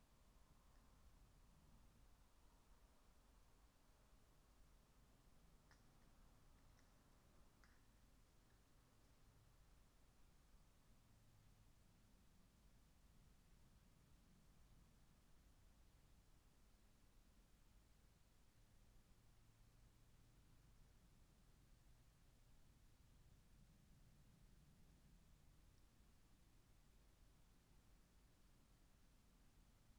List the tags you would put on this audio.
Soundscapes > Nature
sound-installation
nature
data-to-sound
soundscape

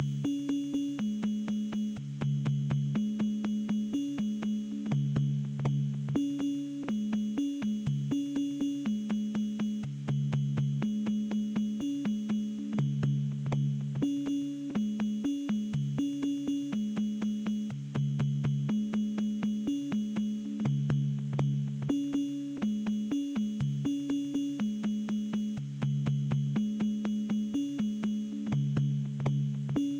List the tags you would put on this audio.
Music > Solo instrument
blocky
chunky
frutiger-aero
loop
notes
synth
tape

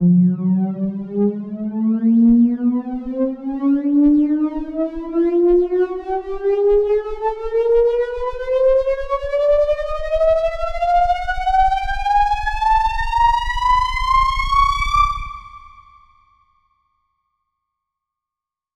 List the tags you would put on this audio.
Music > Solo instrument
uplift
128

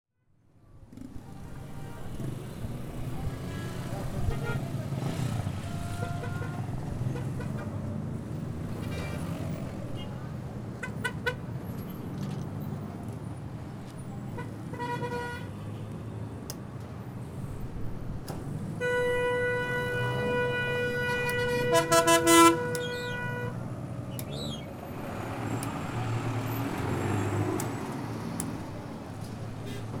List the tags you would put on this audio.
Soundscapes > Indoors
America
Bolivia
Cochabamba
field
market
recording
South
street
traffic

